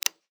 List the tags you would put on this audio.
Sound effects > Human sounds and actions
activation button click interface off switch toggle

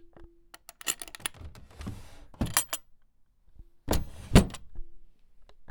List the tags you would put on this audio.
Sound effects > Objects / House appliances
drawer dresser open

